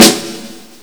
Instrument samples > Percussion
its a snare. its nice. i have used this on my it songs for 20 years.